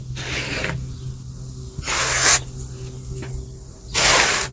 Sound effects > Human sounds and actions
FOLYMisc-Samsung Galaxy Smartphone, CU Skids, Concrete, Gravel, Pavement Nicholas Judy TDC
Skids on concrete, gravel or pavement.
concrete, foley, gravel, pavement, Phone-recording, skid